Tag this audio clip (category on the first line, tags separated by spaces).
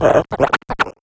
Sound effects > Electronic / Design
video-game fun RPG game-design ability strange vst scifi magical dungeons-and-dragons prankster abstract funny gaming weird fantasy magician prank sci-fi sorcerer dnd effect jester spell game sorcery magic sound-design